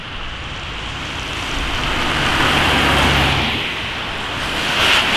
Sound effects > Vehicles
Car00064434CarMultiplePassing
automobile, car, drive, field-recording, rainy, vehicle